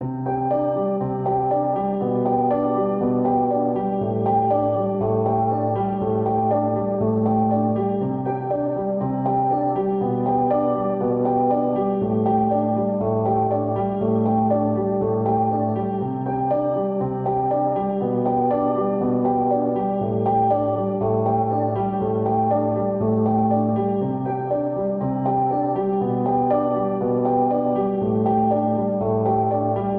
Music > Solo instrument
Piano loops 018 efect 4 octave long loop 120 bpm
120, 120bpm, free, loop, music, piano, pianomusic, reverb, samples, simple, simplesamples